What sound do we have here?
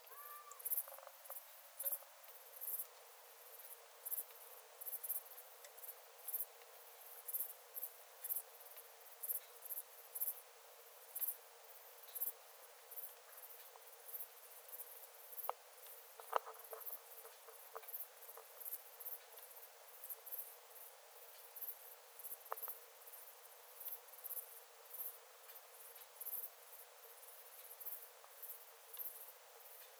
Sound effects > Animals
Recorded with TASCAM DR100mk2 +rode stereo mic. Night time field grasshoppers crickets
night, crickets